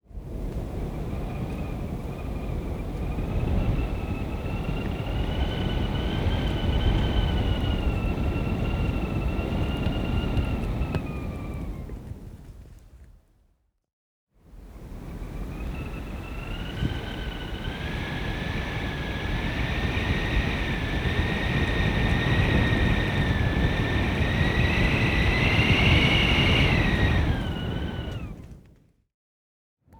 Sound effects > Natural elements and explosions
Wind - Multiple Recs
Multiple separate recordings of wind passing through a crack. Recorded with a Zoom H1essential.